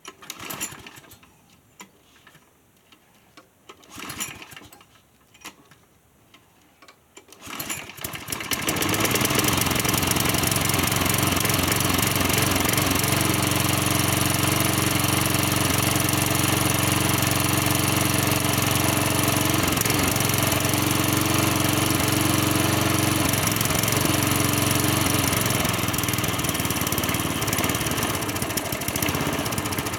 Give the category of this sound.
Sound effects > Other mechanisms, engines, machines